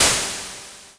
Sound effects > Electronic / Design
Matchstick fire being extinguished abruptly, with a clearly telegraphed sizzle. Variation 1 of 3.
dwindling, extinguish, fire, matchstick, snuffed